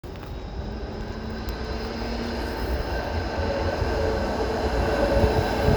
Soundscapes > Urban
A tram passing the recorder in a roundabout. The sound of the tram can be heard. Recorded on a Samsung Galaxy A54 5G. The recording was made during a windy and rainy afternoon in Tampere.